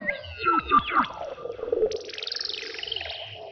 Soundscapes > Synthetic / Artificial
LFO Birdsong 54
LFO, Birdsong, massive